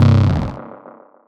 Instrument samples > Synths / Electronic
CVLT BASS 102
bass, bassdrop, clear, drops, lfo, low, lowend, stabs, sub, subbass, subs, subwoofer, synth, synthbass, wavetable, wobble